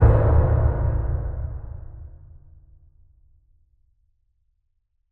Sound effects > Electronic / Design
HELLISH GRAND SUBBY BOOM
BRASIL, BASSY, EXPLOSION, BOLHA, PROIBIDAO, IMPACT, RUMBLING, RATTLING